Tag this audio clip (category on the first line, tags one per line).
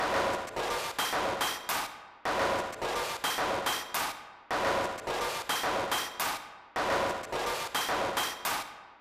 Instrument samples > Percussion
Samples
Loopable
Ambient
Soundtrack
Industrial
Weird
Underground
Loop
Alien
Drum
Dark
Packs